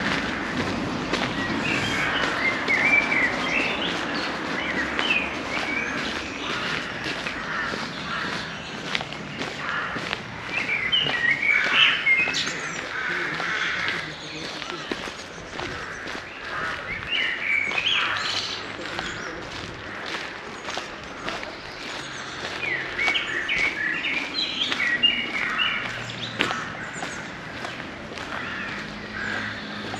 Soundscapes > Nature
📍 City Park, Rawa Mazowiecka 🔊 Peaceful Bird Chorus & Nature Walk 🌍 Poland
🎙️ Details: A unique recording of serene bird songs and natural ambience captured in City Park, Rawa Mazowiecka. This gentle soundscape takes you on a peaceful stroll through one of Poland's charming municipal parks, where local birds create a beautiful natural symphony among the trees and pathways.